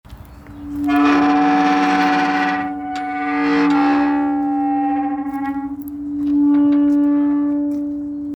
Sound effects > Vehicles
Truck Tailgate Groaning, Dry, Needs Grease.
Field Recording while I was opening the rear tailgate of our work truck. The hinges are dry! Greasing needed! This sound can be used for any gate, door...